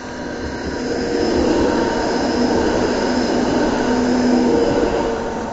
Soundscapes > Urban
Passing Tram 7
city, field-recording, outside, street, trolley